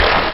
Instrument samples > Percussion
A chorused liquichurn aliendrum.